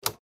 Sound effects > Other mechanisms, engines, machines
Typewriter Key Press 05
acoustic, analog, foley, key-clack, key-click, key-press, keystroke, lever-action, manual-typewriter, mechanical, office-sound, old-machine, retro, sfx, single-key, sound-effect, typewriter, typing, vintage, writing-machine